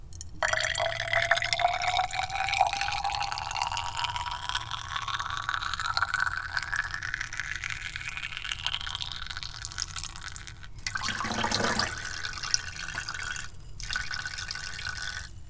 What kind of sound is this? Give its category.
Sound effects > Objects / House appliances